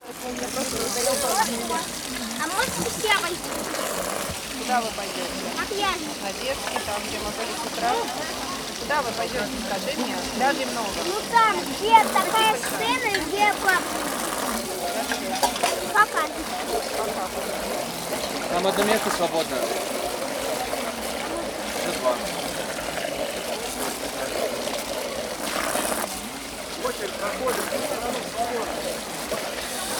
Soundscapes > Nature
WATRDran-XY Zoom H4e Mess Area Of Admin Camp-Washing at Camp Sink SoAM Piece of Insomnia 2025
Fade In\Out 0.5 sec, Low Shelf about -6Db A Piece of Insomnia 2025 This is a small field recording library capturing a day in the life of volunteers and attendees at one of the world's most renowned international animation festivals. All audio was recorded on a single day—July 20, 2025. Immerse yourself in the atmosphere of a digital detox: experience how the festival's participants unwind far from the urban hustle, amidst vast fields and deep forests, disconnected from the internet and cellular networks. Кусочек «Бессонницы» 2025 Это маленькая шумовая библиотека, состоящая из полевых записей, запечатлевшая один день из жизни волонтёров и посетителей одного из самых известных в мире международных фестивалей анимации. Все аудиодорожки были записаны в один день — 20 июля 2025 года. Погрузитесь в атмосферу цифрового детокса: услышьте, как участники фестиваля отдыхают вдали от городской суеты, среди бескрайних полей и густых лесов, в отрыве от интернета и сотовой связи.